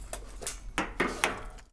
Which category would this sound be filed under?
Sound effects > Objects / House appliances